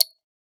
Sound effects > Objects / House appliances
Jewellerybox Shake 8 Hit
metallic, jewellery, jewellery-box